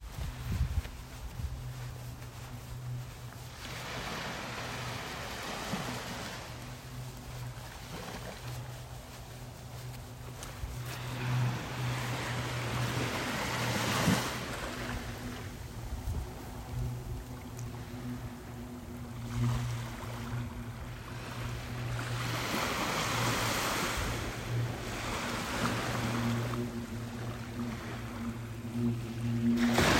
Soundscapes > Nature
I went on a short walk down to the beach around a mile from my house. A plane flies above at the start of the clip. Great audio from iPhone 15 internal mic